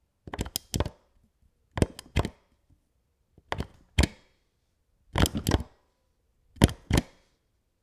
Sound effects > Objects / House appliances
250726 - Vacuum cleaner - Philips PowerPro 7000 series - Power button
Powerpro-7000-series, Shotgun-mic, Sennheiser, cleaner, Vacum, MKE600, Hypercardioid, Tascam, vacuum, FR-AV2, MKE-600, Shotgun-microphone, Single-mic-mono, vacuum-cleaner, aspirateur, Powerpro, 7000